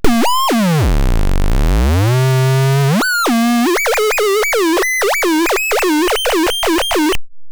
Sound effects > Electronic / Design
Optical Theremin 6 Osc dry-072
Robot, Scifi, Bass, noisey, Noise, Electro, Electronic, FX, Digital, Sci-fi, Sweep, Optical, DIY, Theremins, SFX, Robotic, Glitch, Experimental, Glitchy, Spacey, Instrument, Handmadeelectronic, Infiltrator, Otherworldly, Dub, Theremin, Synth, Trippy, Alien, Analog